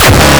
Instrument samples > Percussion
Industrial Hardtechno Kick 3
Stupid sound synthed with phaseplant randomly.
Distorted, Hardstyle